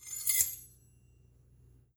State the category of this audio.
Sound effects > Objects / House appliances